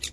Sound effects > Animals
ANMLAmph-Samsung Galaxy Smartphone, CU Frog, Chirp, Single Nicholas Judy TDC
A single frog chirp.
chirp, Phone-recording, single, frog